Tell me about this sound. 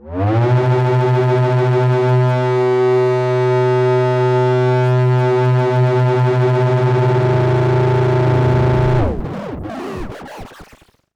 Sound effects > Experimental
Analog Bass, Sweeps, and FX-054
bass, sweep, bassy, dark, mechanical, complex, effect, robot, synth, scifi, basses, sfx, retro, sample, oneshot, korg, vintage, robotic, weird, snythesizer, trippy, electronic, sci-fi, pad, machine, analog, analogue, electro, alien, fx